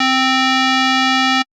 Instrument samples > Synths / Electronic
05. FM-X ODD2 SKIRT6 C3root
Yamaha, Montage, MODX, FM-X